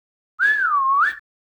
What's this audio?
Speech > Other
Another sound effect of a whistle. Useful to use for a dog call or any other circumstances you can think of also. Made by R&B Sound Bites if you ever feel like crediting me ever for any of my sounds you use. Good to use for Indie game making or movie making. This will help me know what you like and what to work on. Get Creative!